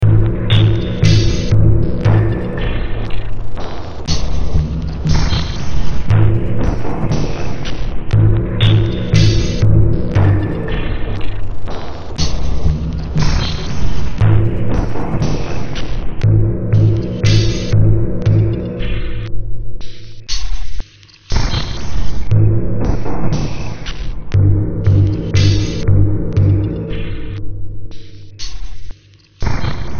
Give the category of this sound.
Music > Multiple instruments